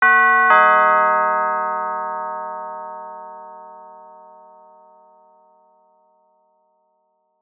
Sound effects > Objects / House appliances
Doorbell made with tubular bells in Logic Pro X
bell, doorbell, door, chime, bells